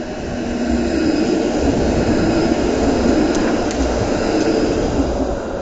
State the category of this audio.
Soundscapes > Urban